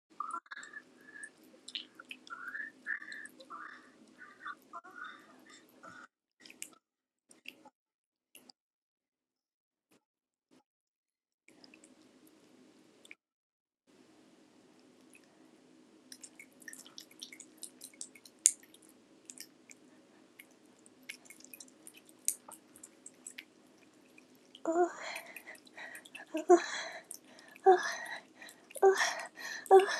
Sound effects > Human sounds and actions
Rubbing, licking, and sucking a girl's vagina! Moaning and fucking included.